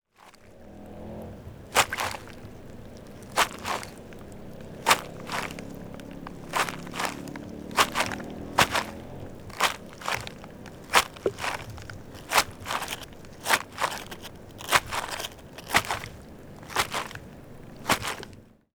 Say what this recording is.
Sound effects > Objects / House appliances
Bolsa plastico mojada Honduras
La Tigra was the first national park in Honduras (established in 1980) and provides over 30% of the drinking water for Tegucigalpa.
plastic, park, wet, bag, Honduras, PERCUSIVE